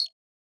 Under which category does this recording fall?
Sound effects > Objects / House appliances